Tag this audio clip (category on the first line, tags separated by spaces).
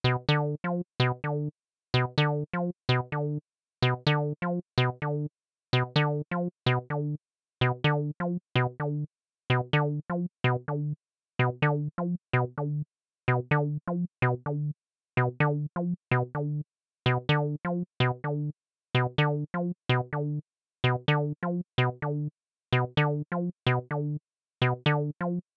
Music > Solo instrument
electronic TB-03 techno 303 Roland hardware Acid Recording synth house